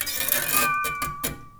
Sound effects > Other mechanisms, engines, machines
Handsaw Pitched Tone Twang Metal Foley 32
plank, tool, smack, twang, saw, perc, fx, vibe, shop, twangy, foley, metal, handsaw, metallic, vibration, sfx, hit, percussion, household